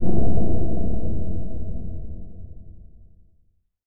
Sound effects > Electronic / Design
UNDERGROUND PROFOUND CAVE BOOM
EDITING, BASSY, HUGE, CINEMATIC, GRAND, RUMBLING, DEEP, BOOM, LOW, EXPLOSION